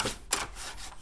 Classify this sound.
Sound effects > Other mechanisms, engines, machines